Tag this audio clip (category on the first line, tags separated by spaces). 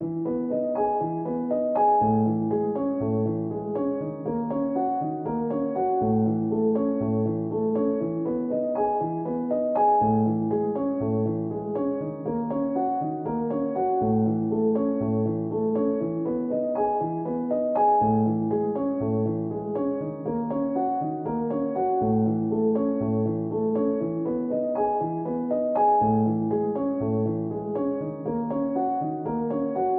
Music > Solo instrument
samples 120 simple loop music piano reverb pianomusic 120bpm simplesamples free